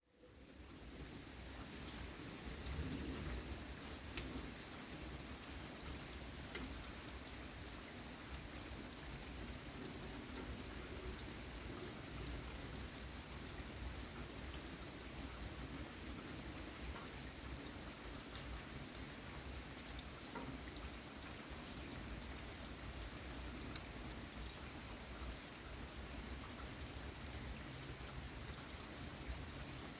Soundscapes > Nature
RAIN-Samsung Galaxy Smartphone, MCU Light Nicholas Judy TDC
Light rain. Some birdsong.
nature, light, Phone-recording, rain